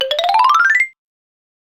Sound effects > Electronic / Design
glissando xylophone
Program : FL Studio Purity